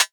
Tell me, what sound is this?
Instrument samples > Synths / Electronic
A hi-hat one-shot made in Surge XT, using FM synthesis.
electronic, fm, surge, synthetic